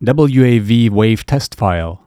Speech > Solo speech
"Double U Ay Vee Wave Test File" spoken by yours truly. Recording: Shure SM7B → Triton FetHead → UR22C → Audacity, some compression and normalization applied.
english, speech, wave, audio, voice, vocal, recording, male, testing, audio-file, test, file, wave-file